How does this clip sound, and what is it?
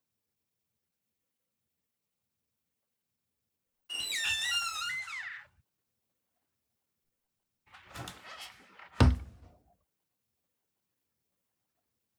Sound effects > Objects / House appliances
Old.Wooden.Door.Open.and.Close
#00:03 Old wooden door open and close. Recorded with zoom h5 recorder, with XYH-5 microphone capsule, removing some noise with Izotope RX.